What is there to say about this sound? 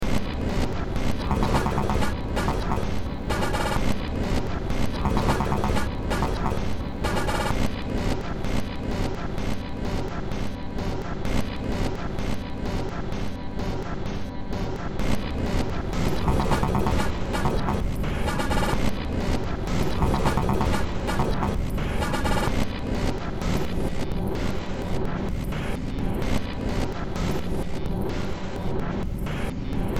Music > Multiple instruments
Short Track #3662 (Industraumatic)
Soundtrack, Ambient, Sci-fi, Cyberpunk, Horror, Noise, Industrial, Underground, Games